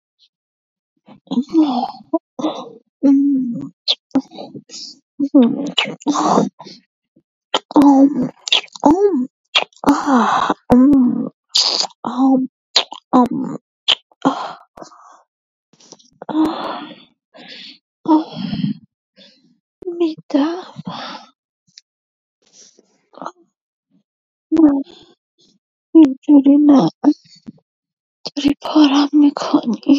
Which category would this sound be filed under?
Speech > Solo speech